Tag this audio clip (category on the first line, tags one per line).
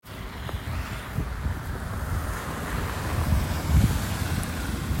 Sound effects > Vehicles
auto
car
city
field-recording
street
traffic